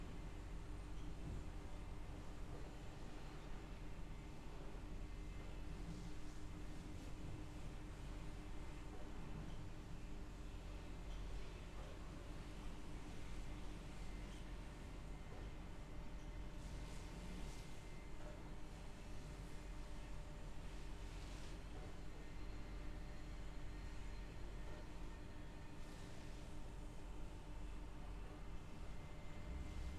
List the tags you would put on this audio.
Soundscapes > Urban
Driving
Forklift
Boxes
Seagull
Fish
Processing

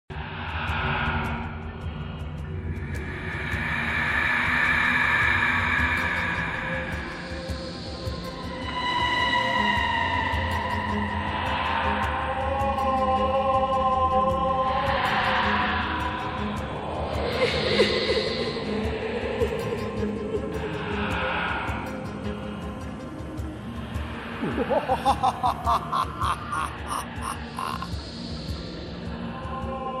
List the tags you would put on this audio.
Music > Multiple instruments
Old
Ambient
Horror
mix
Scream
Atmosphere
Evil
Nightmare
Scary
Drone
Ghost
Spooky
Fantasy
Sound
Creepy
Halloween
Zoombie
Dark
Thriller
freak
fear

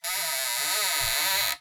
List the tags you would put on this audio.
Sound effects > Other mechanisms, engines, machines
Creaks
Hinge
Squeaky

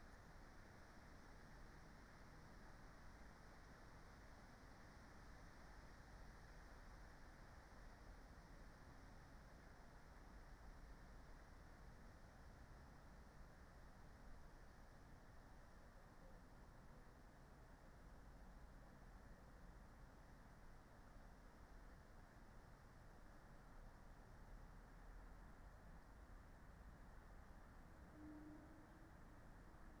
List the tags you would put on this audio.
Soundscapes > Nature
modified-soundscape
Dendrophone
artistic-intervention
soundscape
natural-soundscape
nature
alice-holt-forest
raspberry-pi
field-recording
weather-data
phenological-recording
data-to-sound
sound-installation

